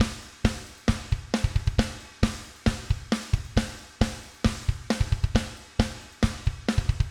Music > Solo percussion
Rammstein Type Drum Loop (135 BPM)
Rammstein Type Drum Loop Made with SSD drumsampler 5
HEAVY, RAMMSTEIN, METAL, DRUM